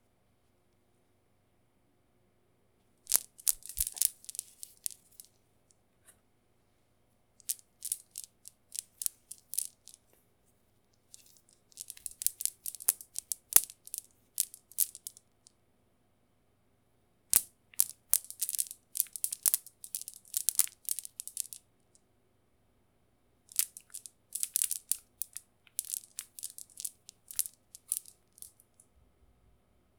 Sound effects > Objects / House appliances
Recorded on Yeti Nano. Literally, beads, on a clothing deco.
door, Beads, curtain